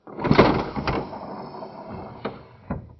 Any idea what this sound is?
Sound effects > Other mechanisms, engines, machines
Laboratory door-like sound effect made by slowing down one of my CD drive operating samples i posted. Recorded (originally) with my phone, later processed with Audacity